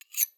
Sound effects > Objects / House appliances
A recording of a kitchen knife being scraped fast over a sharpener.
metal, friction, knife, kitchen, scrape, fast